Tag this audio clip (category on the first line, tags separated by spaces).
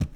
Sound effects > Objects / House appliances
household hollow drop cleaning knock tip scoop pour kitchen garden carry metal plastic water object spill foley slam container debris shake liquid fill tool bucket handle lid clang clatter pail